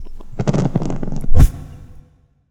Sound effects > Objects / House appliances
Candle flame flickers, blown out
A quick splice of my recording and the sound below, emulates a candle struggling in the wind or having air blown at it, then quickly blowing out. Added a bit of reverb to it for room echo.